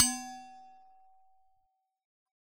Sound effects > Objects / House appliances
Resonant coffee thermos-025
sampling, recording